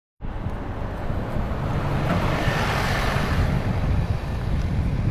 Sound effects > Vehicles
A bus passes by
bus-stop, bus, Passing